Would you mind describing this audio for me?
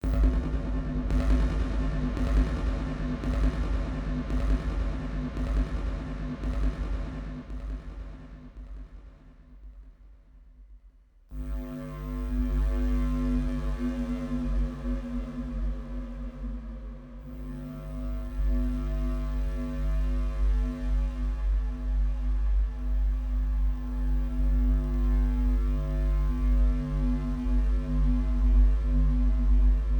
Sound effects > Experimental
Cavernous Electrical Buzz
Made by touching a live audio jack to various metallic elements, and then processed in real time through a cavernous reverb. Ominous, dark buzz sounds.
warning, siren, big, low, pitch, ominous, audio, shock, dark, drone, huge, interference, voltage, zap, reverb, gigantic, alert, electricity